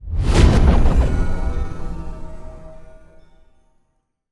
Sound effects > Other
Sound Design Elements Impact SFX PS 051
effects, hit, heavy, transient, hard, collision, smash, strike, design, force, sharp, explosion, power, impact, shockwave, audio, sfx, blunt, cinematic, thudbang, percussive, game, rumble, crash, sound